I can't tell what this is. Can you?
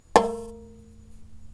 Sound effects > Other mechanisms, engines, machines
Woodshop Foley-067
tools, perc, foley, bang, sfx, shop, crackle, boom, knock, strike, little, fx, sound, oneshot, wood, pop, bop, thud, bam, percussion, rustle, tink, metal